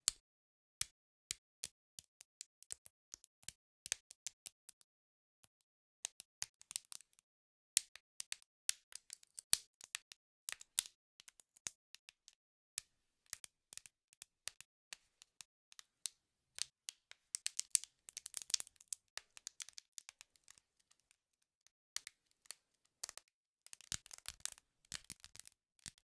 Sound effects > Natural elements and explosions
Sparks highDensity
Processed cracking bark to simulate sparks. To be added for a campfire atmosphere in a soundscape. Studio-Recording; recorded on a Røde NTG 2, MOTU M2 and Reaper. Sound processing: INA GRM Tools Shuffle.
bark, bonfire, burning, campfire, crackle, crackling, fire, wood